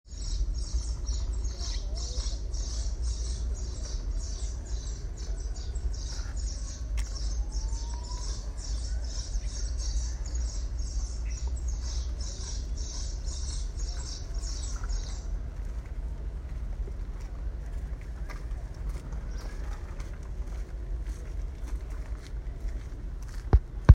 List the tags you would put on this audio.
Sound effects > Animals
animal bird small

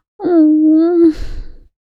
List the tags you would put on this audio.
Sound effects > Human sounds and actions
longing sigh yearning

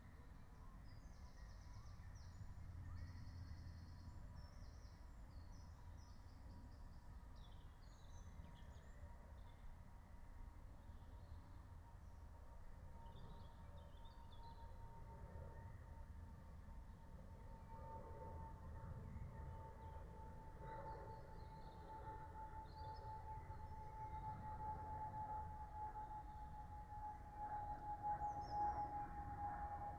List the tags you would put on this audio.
Soundscapes > Nature
natural-soundscape raspberry-pi soundscape phenological-recording alice-holt-forest field-recording meadow nature

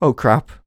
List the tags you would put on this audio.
Speech > Solo speech
singletake word oneshot

